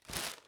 Sound effects > Human sounds and actions
Sound used originally for the action of picking up a paper bag. Recorded on a Zoom H1n & Edited on Logic Pro.
Rustle, PaperBag, Foley, Crunch